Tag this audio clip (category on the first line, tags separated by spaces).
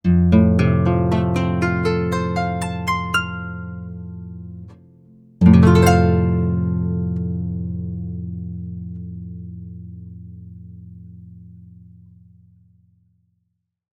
Music > Solo instrument
arpeggio recording Melody paraguay asuncion Harp field south america